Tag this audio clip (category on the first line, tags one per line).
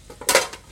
Sound effects > Objects / House appliances
kitchen
pan
lid